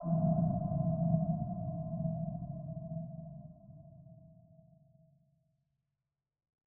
Sound effects > Electronic / Design
LOW BURIED IMPACT
BRAZIL, RATTLING, BRASILEIRO, PROIBIDAO, BOLHA, BRASIL, BOOMY, DEEP, FUNK, RUMBLING, BASSY, MANDELAO, LOW, BRAZILIAN